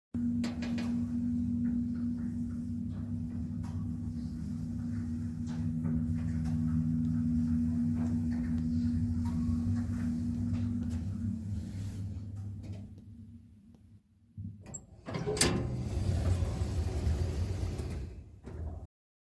Sound effects > Other mechanisms, engines, machines
Ascending elevator, and elevator´s door opening. Can be used for any type of gate opening or something creative.
opening, door, elevator